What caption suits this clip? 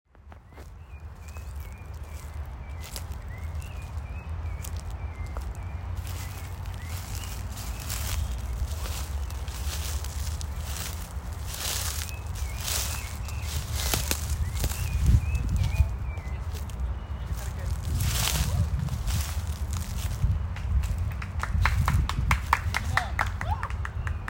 Soundscapes > Nature
Walking on Leaves
Me walking on a bunch of crunchy leaves in a park in Brazil. It was a Friday morning, so it was very quiet. I was recordind a short film with some friends (you can hear them clapping and cheering towards the end #0:16 is when they start) and needed to get some stepping sounds. I recorded this on the voice memos app on my phone.
Field-Recording, Steps, People, Birds, Walking, Grass